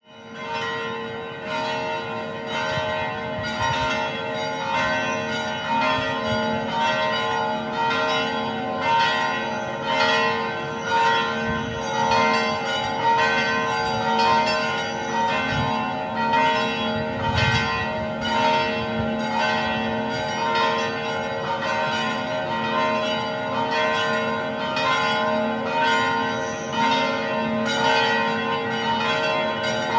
Soundscapes > Urban
Nice - Church Bells Nice, France
Recorded on an iPhone SE from a top floor window.